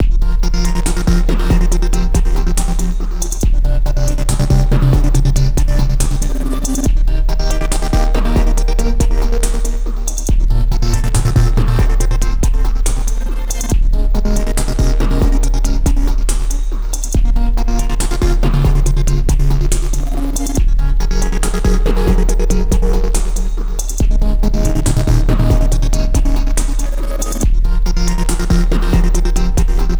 Music > Multiple instruments
Gritty Gated Vapor-Trap Beat
A trippy trappy electronic beat created using one of my previous string loops, chopped up in FL Studio using Mictronic, Shaperbox, Raum, Ruin, and other editing/processing done in Reaper
140bpm, ambient, beat, chill, choppy, dance, dark, drum, drumbreat, drum-loop, drums, edm, electronic, fantasy, future, gated, groovy, idm, industrial, kit, loop, loopable, loops, melodic, melody, perc, percs, synth, trap, trippy